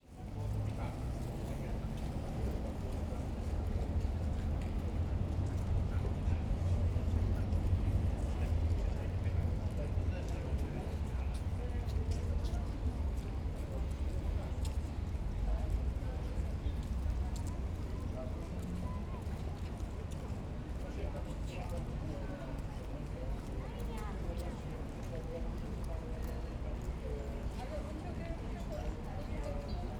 Soundscapes > Urban
04. AMBIENCE Jarmark Jakubowy Distant Crowd Festival Market Cathedral Street Old Town Music Traffic Zoom F3 SO.1
Jarmark Jakubowy 2025.07.26 Annual festival held by Archcathedral in Szczecin. Field recording in the Old Town district, including crowd, traffic, marketplace, discussion, background music, children, conversation and city ambience. Recorded with Zoom F3 and Sonorous Objects SO.1 microphones in stereo format.